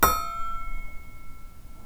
Sound effects > Other mechanisms, engines, machines
metal shop foley -085
boom, bang, strike, sfx, foley, perc, crackle, bop, fx, little, tink, oneshot, pop, tools, thud, bam, rustle, percussion, metal, wood, sound, shop, knock